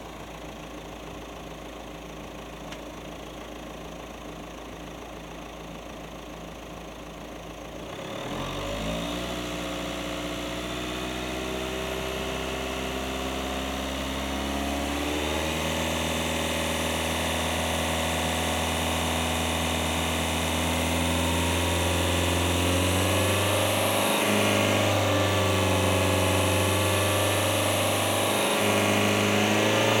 Sound effects > Vehicles
bluehdi rev hold
2022 Peugeot 1.5 BlueHDI diesel engine idles and holds varying RPMs up to 4,000, then the throttle is dropped. Recorded with my phone.